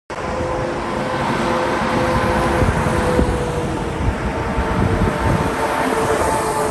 Sound effects > Vehicles
Sun Dec 21 2025 (23)
highway, road, truck